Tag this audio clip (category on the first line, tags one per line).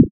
Instrument samples > Synths / Electronic
additive-synthesis
bass